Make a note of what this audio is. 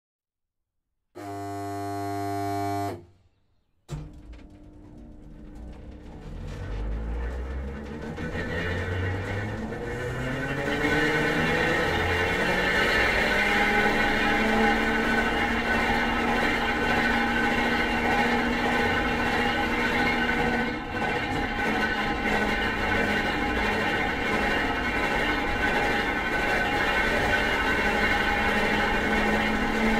Soundscapes > Other
Railway Turntable
German railroad turntable from ERA III (steam era).